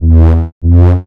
Instrument samples > Synths / Electronic
Asym Bass 1 E
Synthed with phaseplant only, I just use Asym mode to module a sine wave. Processed with Waveshaper. Sometimes I hear it in synthwave, I was always tought that it is a special snare, so I think you can layer it on your kick as a snare too.
Analog, Asym, Bass, snare, Synthwave